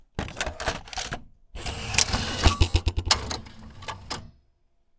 Sound effects > Other mechanisms, engines, machines
U-matic VTR tape insert sound
Sound of a Sony VO-5630 U-matic tape recorder cassette intake. At the very start of the sound you can hear the cassette being inserted then shortly after the front loading mechanism takes the cassette inside the machine. Recorded with a Zoom H1n.
broadcast, cassette, factory, industrial, machine, machinery, mechanical, motor, noise, old, tape, u-matic, umatic, vcr, vhs, vtr